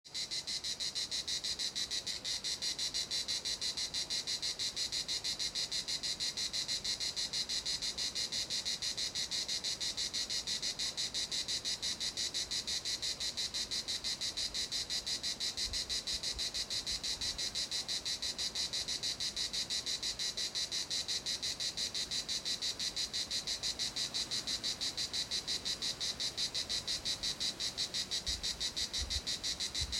Soundscapes > Nature
Rua Outeiro de São Pedro
Clicara sounds recorded in central Portugal close to a lake, smooth wind in the background
cicara, lake, cricket, insect